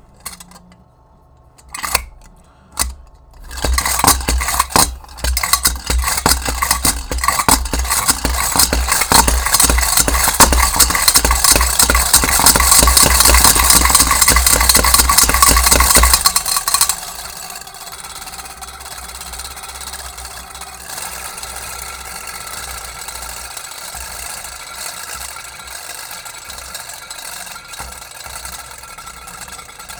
Sound effects > Objects / House appliances
TOYMech-Blue Snowball Microphone, CU Top, Spiral Plunger, Push, Spinning Nicholas Judy TDC
A spinning top spiral plunger pushing then spins freely.